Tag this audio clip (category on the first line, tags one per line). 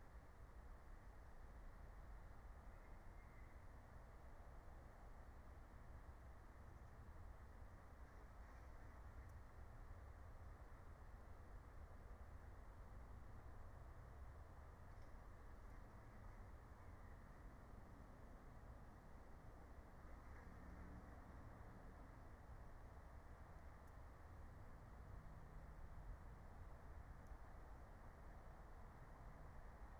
Soundscapes > Nature
nature
phenological-recording
raspberry-pi
field-recording
meadow
soundscape
natural-soundscape
alice-holt-forest